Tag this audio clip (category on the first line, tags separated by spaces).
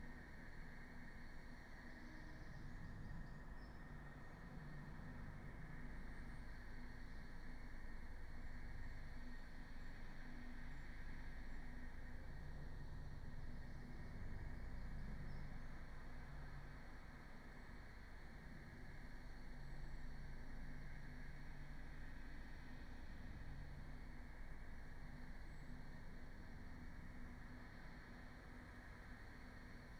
Soundscapes > Nature
phenological-recording nature sound-installation